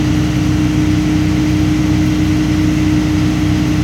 Sound effects > Vehicles
MAN/Solaris bus engine cruising at ~2000 RPM.
bus, diesel, drive, driving, engine, motor, vehicle, whine